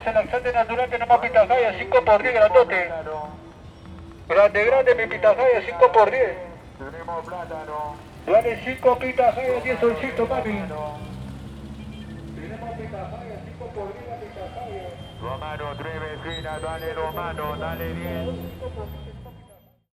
Speech > Solo speech
VENDEDOR DE PITAHAYA y DE PLATANO - LIMA - PERÚ
Vocal Sound. A group of street vendors, each with a megaphone advertising bananas, and another selling pitahaya on Colmena Street - Lima. Recorded with a Tascam DR 40.
VOCAL, peru, COLMENA, BANANA, PITAHAYA, lima, STREET, coster